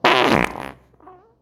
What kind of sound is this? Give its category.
Sound effects > Other